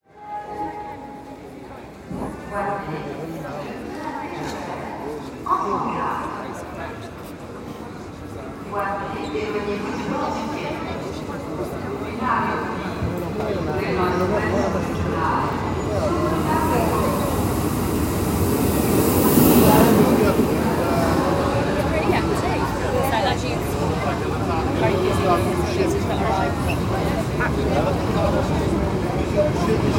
Soundscapes > Urban
Recorded on an iPhone SE.